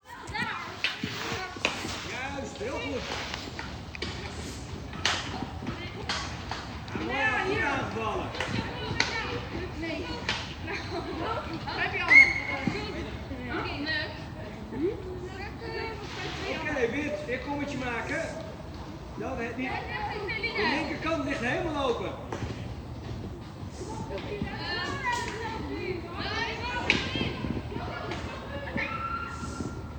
Soundscapes > Urban
Dutch girls hockey training with male coach evening HZA
Dutch hockey girls being trained by a male coach. Recorded in the evening with an iPhone 16 in stereo.